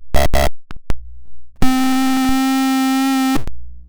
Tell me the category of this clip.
Sound effects > Electronic / Design